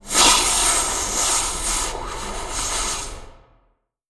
Sound effects > Objects / House appliances
TOONSwsh-CU Windy Swooshes 02 Nicholas Judy TDC
Blue-brand, Blue-Snowball, cartoon, swoosh, whoosh, wind, windy